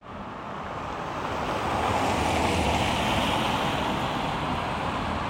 Soundscapes > Urban
Car driving by recorded in an urban area.
car, traffic, vehicle